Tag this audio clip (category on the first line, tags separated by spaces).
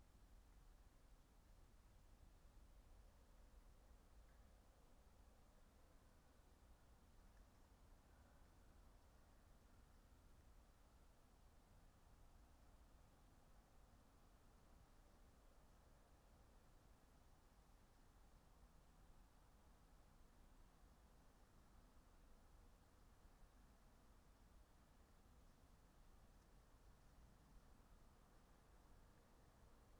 Soundscapes > Nature
artistic-intervention raspberry-pi sound-installation modified-soundscape Dendrophone weather-data data-to-sound field-recording phenological-recording natural-soundscape alice-holt-forest soundscape nature